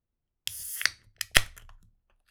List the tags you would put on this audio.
Soundscapes > Other
can H1n

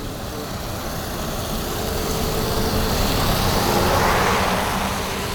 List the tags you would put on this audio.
Sound effects > Vehicles
vehicle transportation bus

